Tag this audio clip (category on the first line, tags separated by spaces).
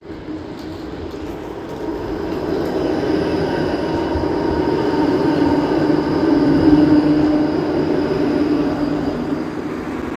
Sound effects > Vehicles
field-recording Tampere tram